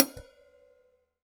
Music > Solo instrument
Vintage Custom 14 inch Hi Hat-011
Drums, Custom, Drum, Hats, Cymbal, Cymbals, Vintage, Percussion, Perc, Metal, HiHat, Oneshot, Kit, Hat